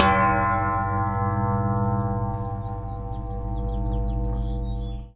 Sound effects > Objects / House appliances
Junkyard Foley and FX Percs (Metal, Clanks, Scrapes, Bangs, Scrap, and Machines) 115
Junk, FX, Junkyard, SFX, Clang, Perc, garbage, Machine, dumping, Atmosphere, Clank, Bang, Robot, dumpster, Environment, Metallic, Dump, Ambience, Foley, Metal, Percussion, scrape, trash, rubbish, rattle, Smash, Robotic, waste, Bash, tube